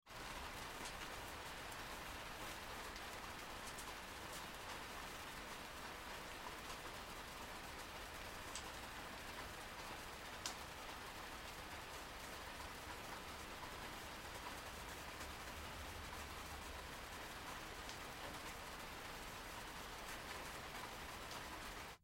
Sound effects > Other
It's raining softly outside and the drops hit the window lightly.
raining, raindrop, raindrops